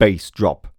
Speech > Solo speech
Bass drop
Tascam, Mid-20s, FR-AV2, voice, U67, Neumann, Man, singletake, chant, oneshot, Vocal, hype, Single-take, Male